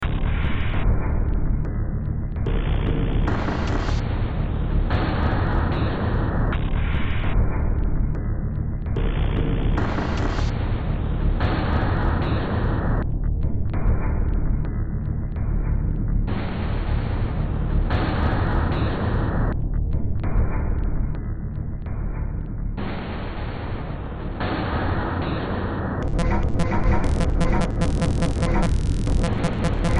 Music > Multiple instruments
Demo Track #4028 (Industraumatic)
Underground, Horror, Ambient, Noise, Games, Soundtrack, Cyberpunk, Industrial, Sci-fi